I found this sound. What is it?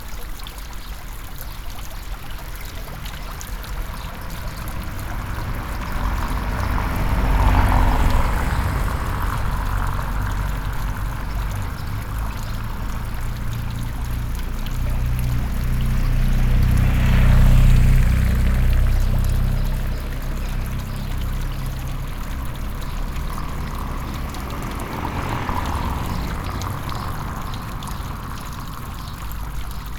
Soundscapes > Urban
250725 09h11 Albi Fountain South of Cathedral OKM1
Subject : Facing south, back to the road. Date YMD : 2025 July 25 Location : Albi 81000 Tarn Occitanie France. Soundman OKM1 Binaural in ear microphones. Weather : Light grey sky (with small pockets of light). A few breezes About 16°c Processing : Trimmed and normalised in Audacity.
in-ear-microphones, grey-sky, Tarn, Friday, fountain, City, OKM-I, in-ear, Soundman, Binaural, France, 2025, Occitanie, 81000, Tascam, Morning, July, OKM1, Albi, FR-AV2, water, field-recording